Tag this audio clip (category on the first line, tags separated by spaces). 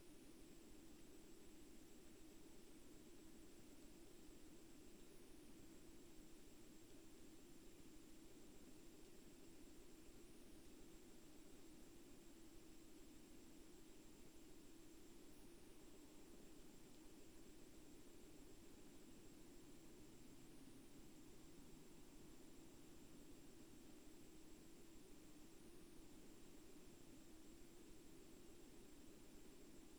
Soundscapes > Nature
soundscape field-recording Dendrophone artistic-intervention modified-soundscape weather-data raspberry-pi nature natural-soundscape phenological-recording sound-installation alice-holt-forest data-to-sound